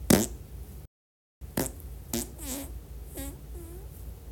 Sound effects > Human sounds and actions
Funny fart
bandlab, comedy, effect, fart, funny, gas, humor, meme, realistic, sfx, short, sound